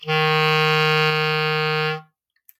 Instrument samples > Wind
Clarinet Sustained Eb3
Clarinet Eb3 (written F3) Use this sample however, you want by looping sampling. Recorded using Laptop microphone.
Clarinet, Sustained, Wind